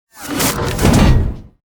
Sound effects > Other mechanisms, engines, machines
Sound Design Elements-Robot mechanism-016
Sound Design Elements-Robot mechanism SFX ,is perfect for cinematic uses,video games. Effects recorded from the field.
actuators, automation, circuitry, clanking, clicking, design, digital, elements, feedback, gears, grinding, hydraulics, machine, mechanical, mechanism, metallic, motors, movement, operation, powerenergy, processing, robot, robotic, servos, sound, synthetic, whirring